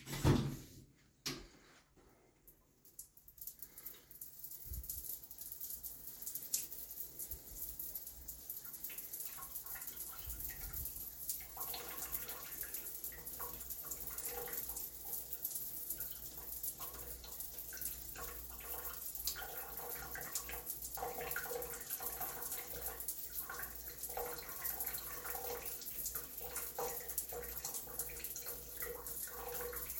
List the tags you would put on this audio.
Sound effects > Objects / House appliances
malfunction shower

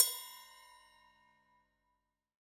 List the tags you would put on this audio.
Music > Solo instrument
Crash Custom Cymbals Drums FX Hat Kit Metal Oneshot Paiste Perc Percussion Ride

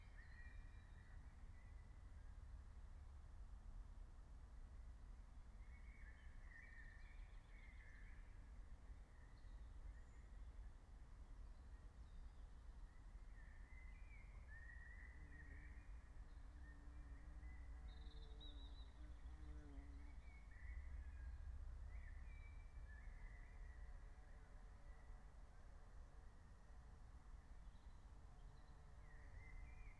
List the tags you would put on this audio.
Soundscapes > Nature
field-recording phenological-recording soundscape natural-soundscape meadow nature raspberry-pi alice-holt-forest

